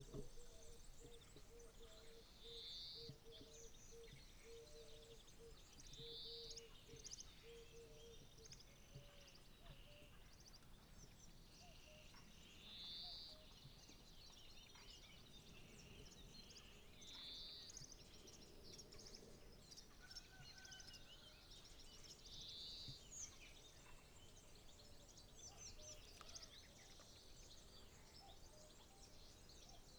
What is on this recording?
Sound effects > Animals
PORTUGAL MORNING LAKE 4
BIRDSONG, NATURE